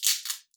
Instrument samples > Percussion
Cellotape Percussion One Shot13
Cellotape Percussion One-Shots A collection of crisp, sticky, and satisfyingly snappy percussion one-shots crafted entirely from the sound of cellotape. Perfect for adding organic texture, foley-inspired rhythm, or experimental character to your beats. Ideal for lo-fi, ambient, glitch, IDM, and beyond. Whether you're layering drums or building a track from scratch, these adhesive sounds stick the landing.
adhesive, ambient, cellotape, cinematic, creative, design, DIY, drum, electronic, experimental, foley, found, glitch, IDM, layering, lo-fi, one, organic, pack, percussion, sample, samples, shot, shots, sound, sounds, tape, texture, unique